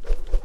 Sound effects > Human sounds and actions
Rope Swooshing
Rope swinging around.
swish, swing, rope, swooshing, swash, swoosh, swosh, nunchucks, whoosh, woosh, swinging